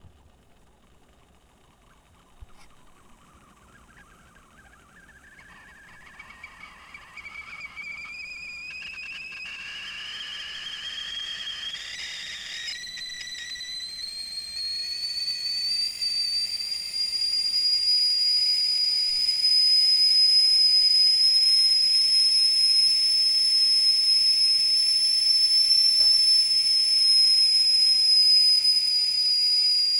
Sound effects > Objects / House appliances
Sound of a teapot beginning to whistle, then ending as it is removed from the heat. Zoom H4nPro